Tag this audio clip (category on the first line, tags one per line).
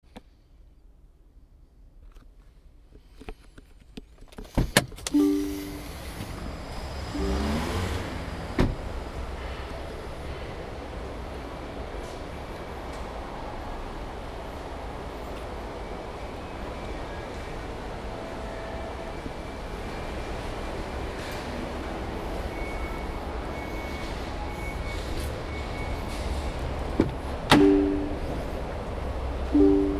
Soundscapes > Urban
car
carpark
parking